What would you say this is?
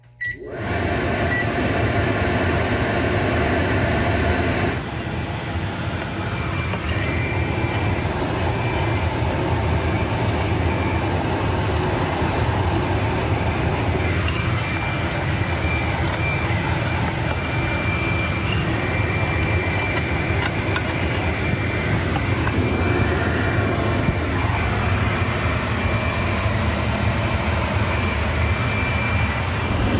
Sound effects > Objects / House appliances
microwave fan
This recording captures 3 different sounds. The first sound is the beep my microwave creates when turning on the integrated fan. The second sound is of a plate scraping on the surface of my stove-top while the fan whirs in the background. The final sound is the noise created when placing the iPhone directly above the ventilation output of the Microwave.
indoor, microwave, whir